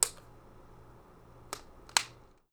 Sound effects > Objects / House appliances
FOLYProp-Blue Snowball Microphone Tic Tac Container, Open, Close Nicholas Judy TDC
A Tic Tac container opening and closing.
Blue-Snowball, foley, Blue-brand, container, open, close, tic-tac